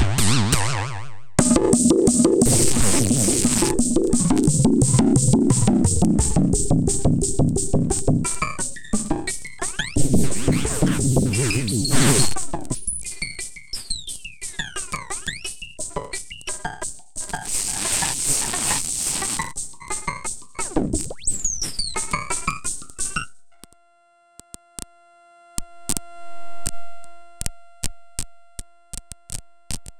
Music > Solo percussion
Simple Bass Drum and Snare Pattern with Weirdness Added 054
Experimental, Interesting-Results, FX-Laden-Simple-Drum-Pattern, Four-Over-Four-Pattern, Snare-Drum, Experimental-Production, Bass-and-Snare, Silly, Glitchy, FX-Laden